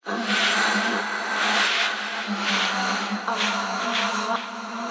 Sound effects > Experimental
SFX Moan Loop 110
Looped vocal or noise moan, great for tension or buildup layering.
layering, moan, tension, buildup, Looped, vocal, edm, noise